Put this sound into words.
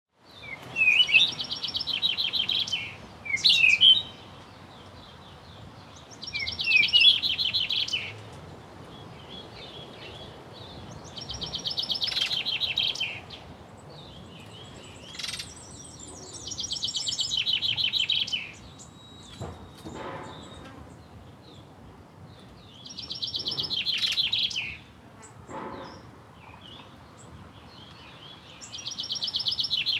Soundscapes > Nature
A bird, a horse and a gust of wind
Birds sing in a tree, a huge gust of wind blows through, and then a horse brays.